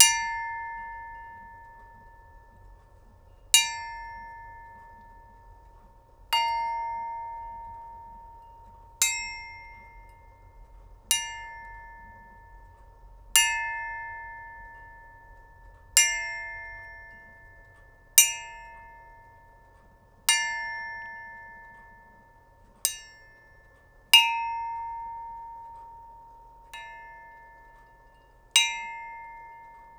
Music > Solo percussion
BELLHand-Blue Snowball Microphone, CU Cowbell Dings, Medium Nicholas Judy TDC
Medium cowbell dings.